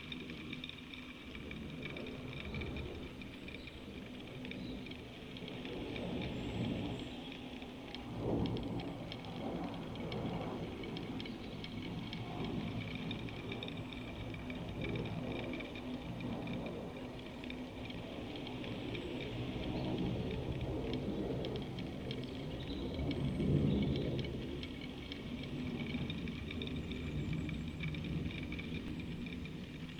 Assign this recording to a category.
Soundscapes > Nature